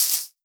Sound effects > Objects / House appliances
LoFi Scribble-03
Pencil on rough paper or parchment, or scratching on a rough, sandy surface. Foley emulation using wavetable synthesis.